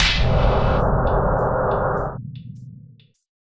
Sound effects > Electronic / Design
Impact Percs with Bass and fx-010
deep, cinamatic, low, oneshot, foreboding, theatrical, bash, crunch, explosion, impact, hit, bass, brooding, explode, ominous, looming, sfx, smash, perc, percussion, mulit, combination, fx